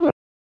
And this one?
Sound effects > Objects / House appliances
Masonjar Touch 1 Tone
Touching a 500ml glass mason jar half filled with water, recorded with an AKG C414 XLII microphone.
glass, mason-jar, rub, squeaky